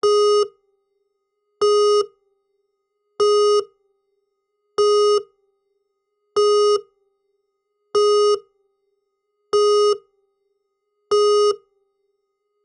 Sound effects > Electronic / Design
Synthed with phaseplant only.
Counting, Nuclear-boom, telephone, Countdown, Phone